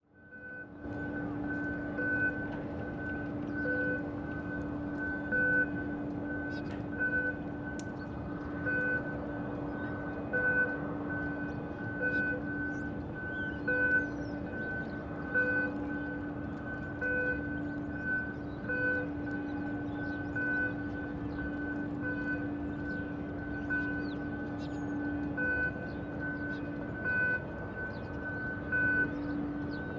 Soundscapes > Urban
St Nazaire-Industrial Bulk Port- crane bip ambiance
Unloading dock, hudge unloading crane, bib while moving.